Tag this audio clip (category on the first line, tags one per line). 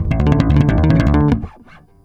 Instrument samples > String
blues
mellow
plucked
loops
oneshots
rock
riffs
loop
fx
charvel
funk
electric
bass
slide
pluck